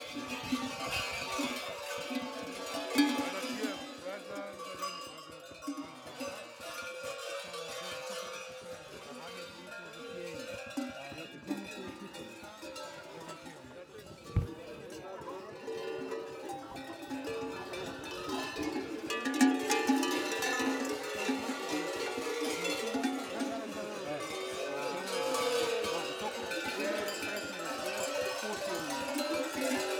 Soundscapes > Other
surva dancing aggressive
Stereo field recording from the famous Surva folk festival in Pernik, Bulgaria. Captures the rhythmic sounds of Survakari (masked dancers) performing a traditional Bulgarian horo dance. The recording features jingling bells, footsteps, crowd ambience, and the deep, resonant beat of the tupan (a large traditional bass drum). A vibrant and energetic example of Bulgaria’s intangible cultural heritage.
surva, dancing, folk, bells